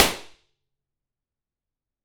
Soundscapes > Other
Subject : An Impulse and response (not just the response.) of my bedroom in Esperaza. Here I have both microphones so one can compare them. What I take is that the Superlux has better SPL, but more noise so none are ideal for those kind of IR. Date YMD : 2025 July 11 Location : Espéraza 11260 Aude France. Recorded with a Superlux ECM 999 and Soundman OKM1 Weather : Processing : Trimmed in Audacity.